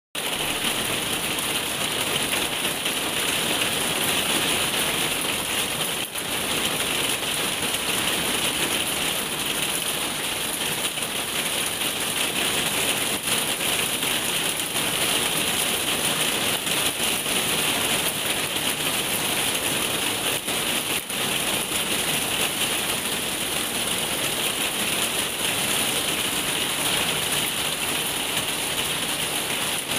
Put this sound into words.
Soundscapes > Nature
rain on plastic roof
Sounds of the rain hitting the plastic roof in my garden.